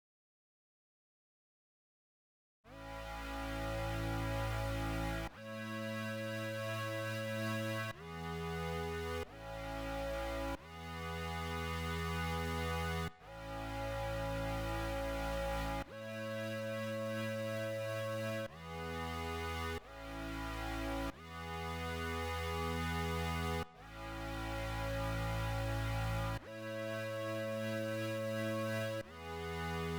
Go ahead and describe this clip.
Music > Solo instrument
91bpm g#m synth
synth across 4 chords in g#minor
glide, keys, synth